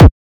Instrument samples > Percussion
Retouched Grv kick 20 from Flstudio original sample pack with FLstudio sampler, I just twisted pogo amount and did some distortion for it. Also tweak pitch and mul amount in Flstudio sampler too. Processed with Edison and ZL EQ. Added a Waveshaper in master channel to prevent it over loudness.